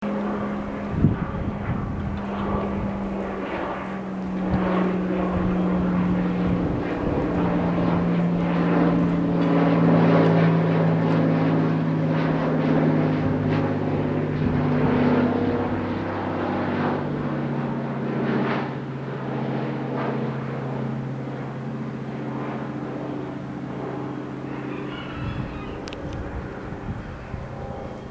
Sound effects > Other mechanisms, engines, machines
CESSNA Flyby 150Mts close
Cessna flyby, 150Mts close, last 2.77 miles for land procedure. Recorded with SONY IC Recorder. Mod. ICD-UX560F
airplane, flight, flying